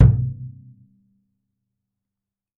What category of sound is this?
Music > Solo instrument